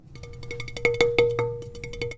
Music > Solo instrument
Marimba Loose Keys Notes Tones and Vibrations 6
A collection of samples using loose marimba keys in a box from a marimba manufacturer in Humboldt County, California. The keys were all rejects due to either mishapes or slightly off tone or timbre, but I sifted through thousnads and found some really nice gems to record. Cant wait to create some strange sounds with these
fx, perc, thud, woodblock, wood, notes, percussion, foley, marimba, tink, block, oneshotes, keys, rustle, loose